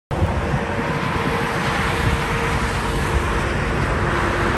Sound effects > Vehicles
Sun Dec 21 2025 (26)
car, highway, road